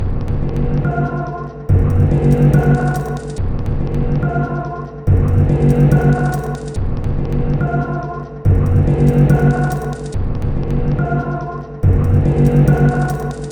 Instrument samples > Percussion
This 142bpm Drum Loop is good for composing Industrial/Electronic/Ambient songs or using as soundtrack to a sci-fi/suspense/horror indie game or short film.
Dark, Weird, Loop, Soundtrack, Loopable, Underground, Samples, Drum, Packs